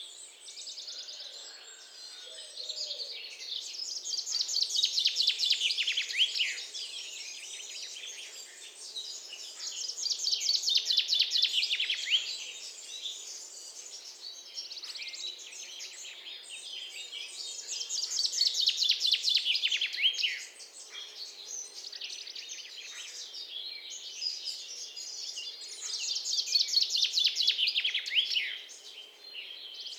Soundscapes > Nature
Sous les arbres (Under the Trees)
A recording made in early June beside a cabin in the woods, a few kilometres from La Palud-sur-Verdon in Provence, France. I was struck by the amount of birdsong close by and the lack of noise pollution from traffic and humans. Recorded using a pair of Sennheiser MKH8040s in ORTF arrangement. Will loop smoothly.